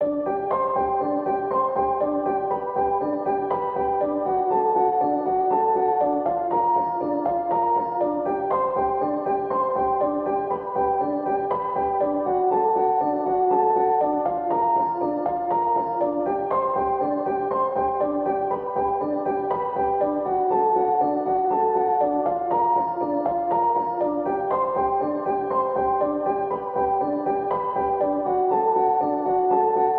Music > Solo instrument
Piano loops 091 efect 4 octave long loop 120 bpm
120, 120bpm, free, loop, music, piano, pianomusic, reverb, samples, simple, simplesamples